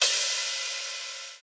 Instrument samples > Percussion
HellCat Hi Hats
One shot sample of a 14" Zildjian K Hi Hats open position